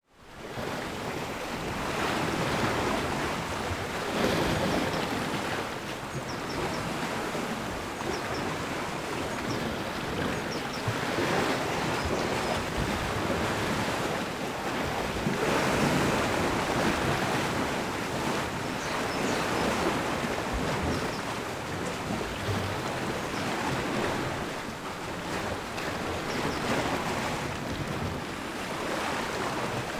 Soundscapes > Nature
Constant Medium Waves And Subtle Birds
Recorded with Zoom H6 XY-Microphone. Location: Agistri / Greece. next to the shore
bird, chirping, field-recording, nature, ocean, sea, water, waves